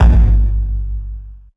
Instrument samples > Percussion
BrazilFunk Percussion 1
Sample used a 707 cowbell from Flstudio orginal sample pack. Processed with Zl EQ, Waveshaper.
Distorted, Percussion